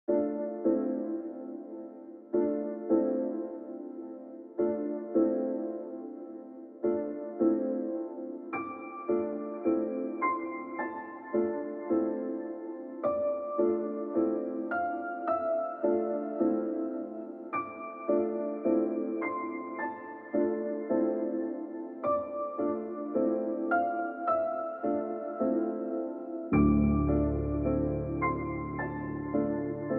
Music > Multiple instruments
mystery+puzzle music - violin version
Inspired by the music from various mystery games I set out to create my own. This version uses a background violin choir. I'm not the best with violins but I hope it's useful nonetheless. Failing to comply will result in your project, any type, being taken down.
dreamy, mysterious, mystery, puzzle, Suspenseful, swelling, violin, violins